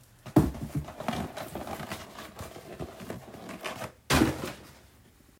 Sound effects > Objects / House appliances
Cardboard box being handled. I created the sound.